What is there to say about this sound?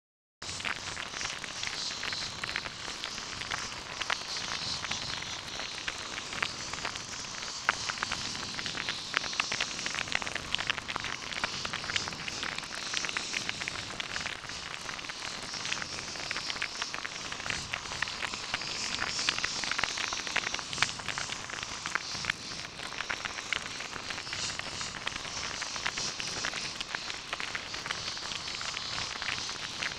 Sound effects > Electronic / Design
Potion Creation SFX
A layered alchemical effect built from four granular variations of a single plastic toy squeak (recorded on Tascam DR-05). Blends bubbling, hissing, and soft gurgles — ready to bring your magic potion, sci-fi vial, or mystical brew to life. If you enjoy these sounds, you can support my work by grabbing the full “Granular Alchemy” pack on a pay-what-you-want basis (starting from just $1)! Your support helps me keep creating both free resources and premium sound libraries for game devs, animators, and fellow audio artists. 🔹 What’s included?
layered, alchemy, bubbling, magic, sci-fi, fantasy, mystical, sfx, liquid, granular, cauldron, gurgle, potion, hiss, brew